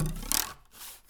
Sound effects > Other mechanisms, engines, machines
metal shop foley -134
bam, sfx, boom, strike, percussion, pop, metal, foley, little, knock, thud, perc, wood, sound, fx, crackle, rustle, shop, tools, oneshot, tink, bop, bang